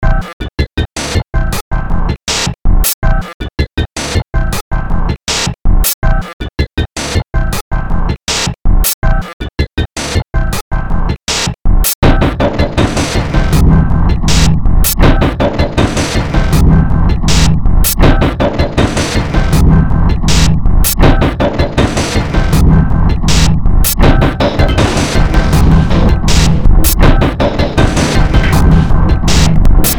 Music > Multiple instruments
Demo Track #3984 (Industraumatic)
Ambient, Cyberpunk, Games, Horror, Industrial, Noise, Sci-fi, Soundtrack, Underground